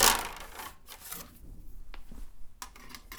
Sound effects > Other mechanisms, engines, machines
metal shop foley -147
bam, bang, boom, bop, crackle, foley, fx, knock, little, metal, oneshot, perc, percussion, pop, rustle, sfx, shop, sound, strike, thud, tink, tools, wood